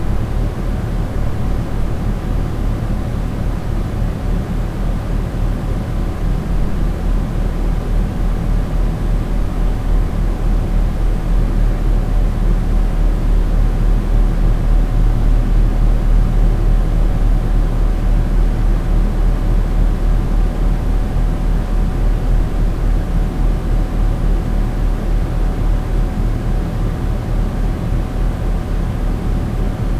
Soundscapes > Indoors
Subject : Indoor ambience of a Barn at night in Gergueil. You can hear the neighbour AC unit. Date YMD : 2025 04 22 near 01:00 Location : Indoor Gergueil France. Hardware : Tascam FR-AV2, Rode NT5 Xy Weather : Processing : Trimmed and Normalized in Audacity.